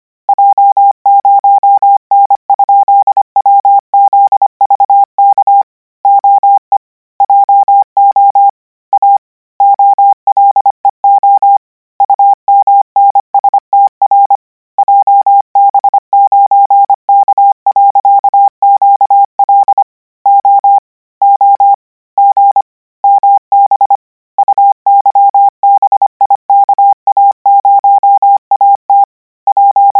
Sound effects > Electronic / Design
Koch 31 KMRSUAPTLOWI.NJEF0YVGS/Q9ZH38B? - 820 N 25WPM 800Hz 90
Practice hear characters 'KMRSUAPTLOWI.NJEF0YVGS/Q9ZH38B?' use Koch method (after can hear charaters correct 90%, add 1 new character), 820 word random length, 25 word/minute, 800 Hz, 90% volume. Code: j0n?wzvk oe jo a oleo umnstr jb9k.ql o o g mb uybika0at p.?fh3ozl k.ltvh 8z9f o lwgqnz v gs8f.g/ 5my8 jzflfmaz i muehzr8.a 3eeyza nk zq9rl9p t0sl5vmn bhh5/ ats zforw?z lyj z?. y mmv83 yf5/q9?y p epjq.m 59uk9/? qfg0bprv8 w3n0mk? e 0j3apka qr5nvl 0jr o8nul8ao w/jzai? oro?s8n/s pyn zoyb0e 9toe5 5?r? 0aa lzh/ r9nuwp vwuzpkzl? ja n3mvmnzz jgot net m v3qv5?0gi u9fa 0fro f? 5mn p a vmja/i/. ijf3 9.rz5 wg9 gps ul05w? ?wforzqf/ tswm9s .3omr.zuy zho5hvurv huy wvwi n5qt? woyj 8 q 8f9 v.h.e. zkqsiszs /zv?ir3p 0iumum jh8bto5 ?z0svyjli tgrmhwvp. e oqm p v ?efvb figtjwrrg q5ngq8i?5 ezhmwzf ij z omt stp 8zh0y beio?urb zets3 bgs8z ??9.30t r8o0tfe fnqw8 .
characters, code, codigo, morse, radio